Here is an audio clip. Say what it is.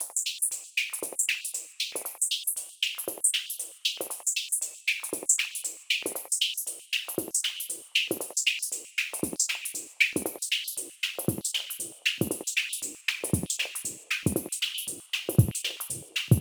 Music > Multiple instruments
117 - Scream Top
I have created this in bitwig studio. it is a moving bandpass filter on the hihat frequencies and a deeper kick sound.
hihats, scream, filtered, percussive, steps, bandpassfilter, pitchmod, top